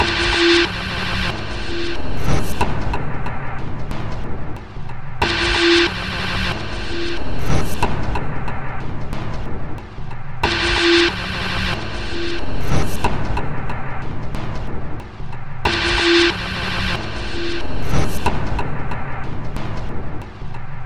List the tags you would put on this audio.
Instrument samples > Percussion
Alien Ambient Dark Drum Industrial Loop Loopable Packs Samples Weird

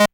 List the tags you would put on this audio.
Sound effects > Experimental
dark analogue alien scifi weird sweep sfx fx bass trippy complex robot snythesizer retro pad oneshot analog sample korg robotic sci-fi basses vintage electronic bassy electro effect mechanical synth machine

